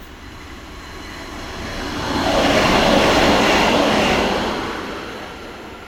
Sound effects > Vehicles
Tram 2025-10-27 klo 20.12.59
Finland, Public-transport, Tram